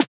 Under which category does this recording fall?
Instrument samples > Synths / Electronic